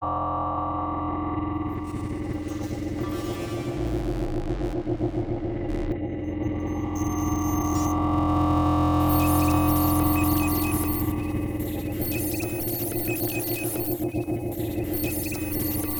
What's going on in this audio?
Soundscapes > Synthetic / Artificial
Grain Space 6
These are my first experiments with a granulator. I believe there will be more volumes. Sounds are suitable for cinematic, horror, sci-fi film and video game design.
sample, samples, sfx, packs, glitch, granulator, soundscapes, free, experimental, sound-effects, electronic, noise